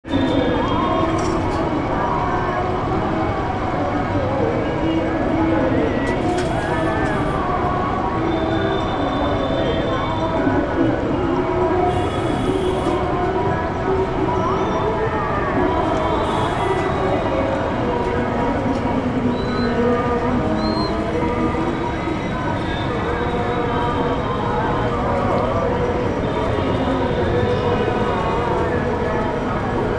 Soundscapes > Urban
Loud India (Flower Please) Uttranchal India Rishikesh Swargashram
Hinduism, bells, Hindu